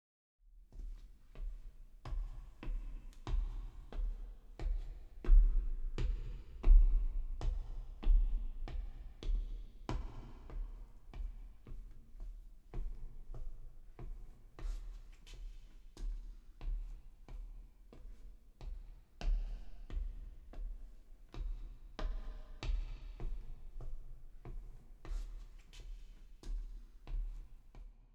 Sound effects > Human sounds and actions
cave steps
steps, walk